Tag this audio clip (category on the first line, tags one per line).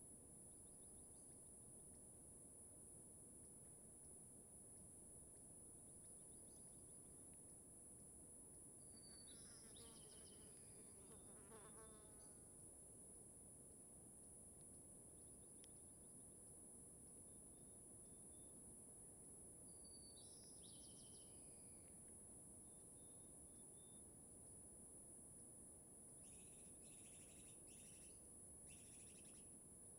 Soundscapes > Nature
wind
field-recording
birds
cicadas